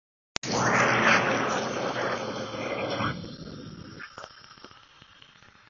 Soundscapes > Urban
Bus leaving 2 2
Where: Tampere Keskusta What: Sound of a bus leaving bus stop Where: At a bus stop in the morning in a mildly windy weather Method: Iphone 15 pro max voice recorder Purpose: Binary classification of sounds in an audio clip